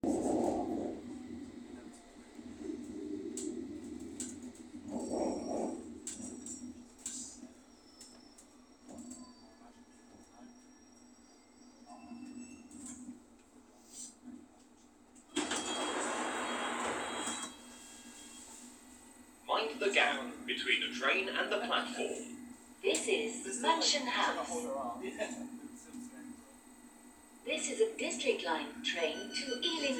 Soundscapes > Urban
Recording from inside the train arriving at Mansion House station on District Line. doors opening and standard announcement including the iconic "Mind the Gap", the name of the station, the line and the next station. Recorded on an A02 Samsung phone ; May 9, 2025 ; 10:14
Ambiant, announcement, Field-recording, London-underground